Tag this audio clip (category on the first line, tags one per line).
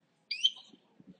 Sound effects > Other mechanisms, engines, machines
Alarm
Voice-Memos